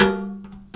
Sound effects > Other mechanisms, engines, machines
Handsaw Pitched Tone Twang Metal Foley 39
foley, fx, handsaw, hit, household, metal, metallic, perc, percussion, plank, saw, sfx, shop, smack, tool, twang, twangy, vibe, vibration